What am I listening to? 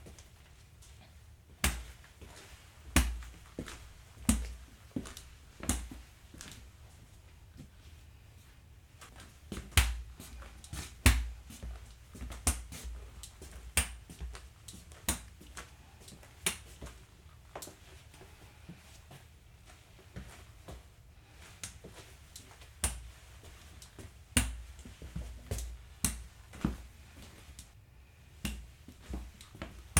Sound effects > Human sounds and actions
walking with cane - various
I couldn't find any sounds of people walking with canes that fit my needs so I made some myself. This is just me (an able-bodied 30-something) walking back and forth in front of my mic using a walking cane in different manners with different gaits. Some on vinyl kitchen floor, some on hardwood hallway floor.